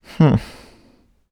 Speech > Solo speech
Sadness - Humfff
sad, FR-AV2, NPC, Man, Voice-acting, Tascam, talk, Vocal, U67, Male, Sadness, sound, Neumann, Mid-20s, oneshot, singletake, voice, dialogue, Video-game, Human, Single-take